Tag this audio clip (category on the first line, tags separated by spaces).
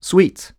Speech > Solo speech
oneshot sweet Vocal joyful U67 dialogue Neumann Single-take Voice-acting Man talk Mid-20s joy Male voice Human FR-AV2